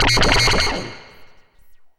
Instrument samples > Synths / Electronic
Benjolon 1 shot36
SYNTH, NOISE, 1SHOT, DRUM, CHIRP